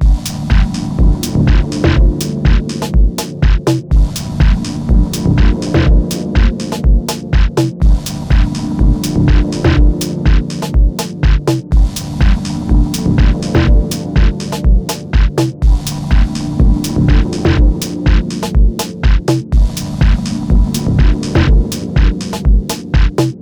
Music > Multiple instruments
Tech House Loop idea
Beat loop made in FL11, nothing fancy, basic stuff.
edm, techno, 164, house, reverb, loop, spaceous, tech